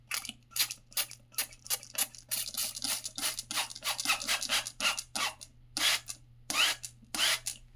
Sound effects > Human sounds and actions
Spraying Cleaner
Spray bottle cleaner being sprayed recorded on my phone microphone the OnePlus 12R
Spray, spray-bottle, cleaner